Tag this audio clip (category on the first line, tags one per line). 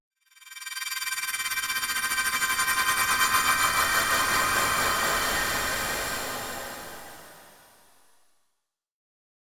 Sound effects > Electronic / Design
high-pitched
magic
reverse
shimmering
tremelo